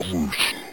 Speech > Solo speech
GRUESOME AUDIO
8bit, chip, gaming, gruesome, retro, speech